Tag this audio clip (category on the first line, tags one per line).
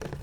Sound effects > Objects / House appliances
garden
clatter
knock
carry
plastic
tip
foley
tool
water
shake
lid
liquid
pour
drop
metal
spill
household
bucket
fill
container
cleaning
pail
slam
handle
object
hollow
debris
clang
kitchen
scoop